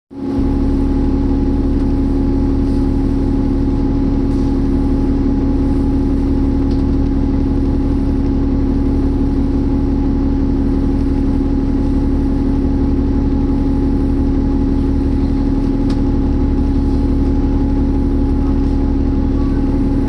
Sound effects > Vehicles
2005 New Flyer D40LF bus idling #1 (MiWay 0552)
I recorded the idling engine of a Mississauga Transit/MiWay bus. This is a recording of a 2005 New Flyer D40LF transit bus, equipped with a Cummins ISL I6 diesel engine and Voith D864.3E 4-speed automatic transmission. This bus was retired from service in 2023.